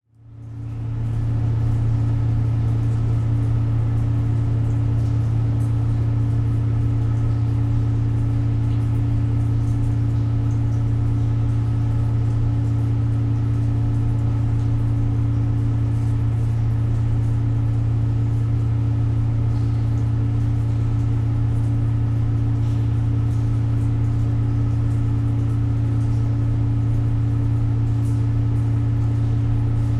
Soundscapes > Urban
A recording of the harmonies produced by machinery in the bottom level of a parking garage. Also contains some drips in the background. Equipment: Pair Clippy Omni mics Zoom F3 Field Recorder